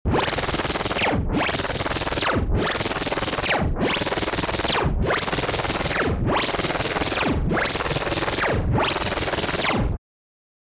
Sound effects > Electronic / Design
Whip Serpent
Another Beast Mode creation. Lasery, resonant filter movement, with stuttered noise.
Filtered, FX, Laser, Resonant, Rhythmic